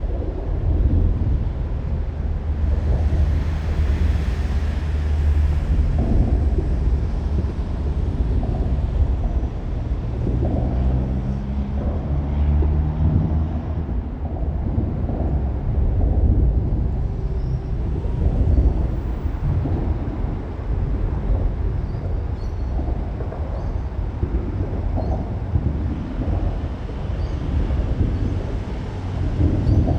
Soundscapes > Urban
under bridge loop
A loop of the soundscape ambiance under a large freeway overpass. Looped using REAPER.
bridge, car, disembodied, loop, overpass, traffic, truck